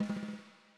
Music > Solo percussion
Snare Processed - Oneshot 10 - 14 by 6.5 inch Brass Ludwig
snares, rim, fx, rimshot, sfx, oneshot, snaredrum, rimshots, snareroll, realdrums, brass, beat, kit, realdrum, crack, reverb, snare